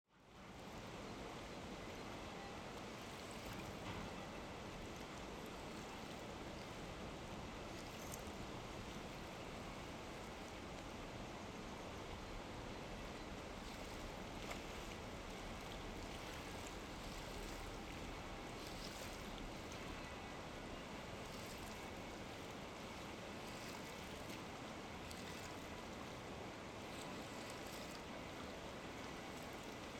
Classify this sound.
Soundscapes > Nature